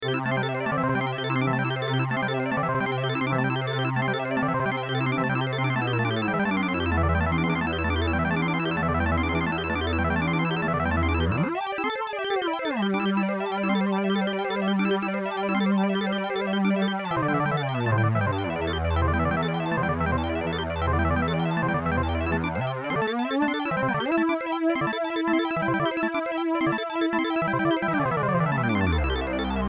Music > Solo instrument
Deepest Dream
This is a mini song I made on BeepBox. It has some deep dream vibes in a retro style. It could be used for some sad or lonely parts of a project. You can use it for free on whatever you want.